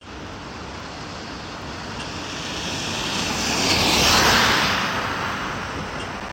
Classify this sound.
Sound effects > Vehicles